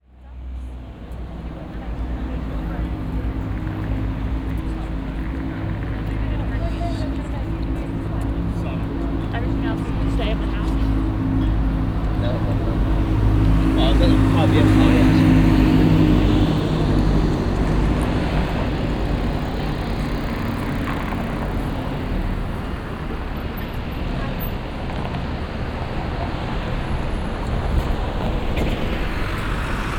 Soundscapes > Urban
Cardiff - Walking Through Town, Towards Womanby St 02
cardiff, city, citycentre, fieldrecording